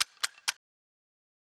Sound effects > Other mechanisms, engines, machines
Ratchet strap-2
clicking, crank, machine, machinery, mechanical, ratchet, strap